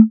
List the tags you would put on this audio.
Instrument samples > Synths / Electronic
fm-synthesis
additive-synthesis
bass

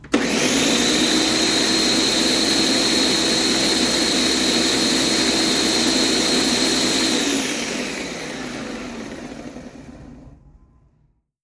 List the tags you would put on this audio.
Sound effects > Objects / House appliances
turn-off
blender
pulse
Phone-recording
turn-on

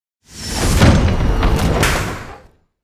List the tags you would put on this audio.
Sound effects > Other mechanisms, engines, machines
digital
elements
metallic
hydraulics
robotic
sound
synthetic
powerenergy
mechanical
processing
automation
motors
operation
grinding
actuators
robot
movement
servos
feedback
whirring
clicking
clanking
mechanism
design
circuitry
machine
gears

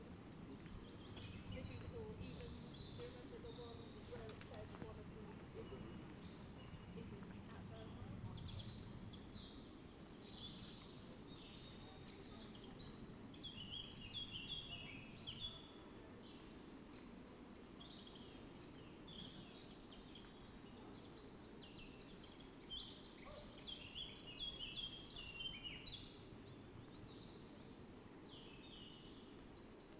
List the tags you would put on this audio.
Soundscapes > Nature
weather-data
phenological-recording
natural-soundscape
artistic-intervention
field-recording
alice-holt-forest
raspberry-pi
nature
sound-installation
soundscape
data-to-sound
modified-soundscape
Dendrophone

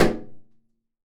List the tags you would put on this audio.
Sound effects > Natural elements and explosions
32
32float
Balloon
Data
float
High
Impulse
IR
Measuring
Pop
Quality
Response

